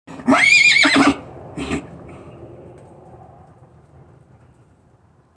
Sound effects > Animals
Horses - Mini Horse or Pony, Short Neigh, Close Perspective
A miniature horse neighs. Recorded with an LG Stylus 2022. Can be used for unicorns and pegasuses.